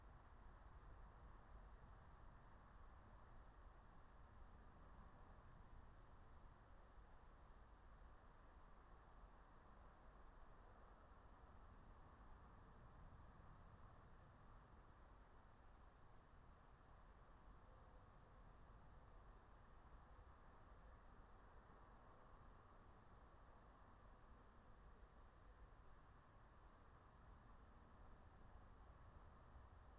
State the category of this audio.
Soundscapes > Nature